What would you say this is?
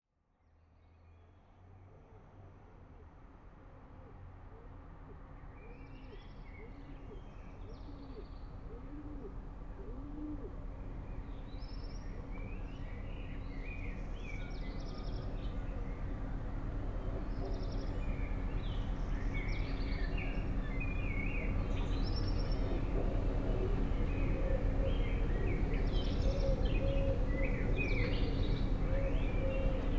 Soundscapes > Urban
Morning urban noise, birds, bells on a small city square
Recorded with Sony ICD-SX1000 at 5AM